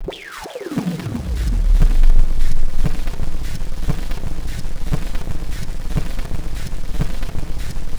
Sound effects > Electronic / Design
FX Laser Blast with Low Frequency Tone
Sounds like a laser blast with low frequency tones after it Created with SynthMaster for iPad
blast, Laser, effect, blasting, FX